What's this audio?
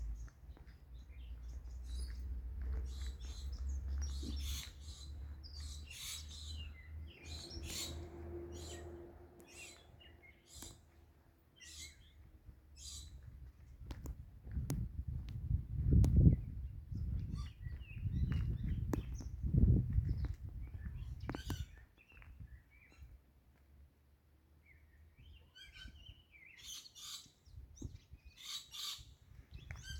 Soundscapes > Nature
nature sounds from Arrabida
Sons da natureza
natural, natureza, sounds